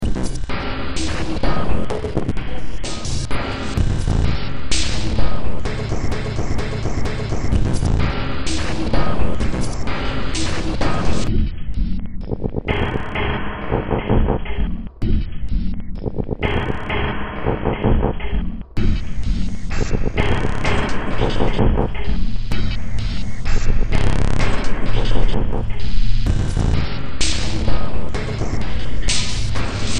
Music > Multiple instruments
Demo Track #3142 (Industraumatic)
Ambient, Cyberpunk, Games, Horror, Industrial, Noise, Sci-fi, Soundtrack, Underground